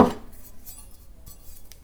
Sound effects > Other mechanisms, engines, machines
metal shop foley -016
boom, thud, pop, metal, crackle, tink, bang, knock, perc, fx, shop, sfx, wood, oneshot, foley, strike, percussion, bop, rustle, tools, sound, bam, little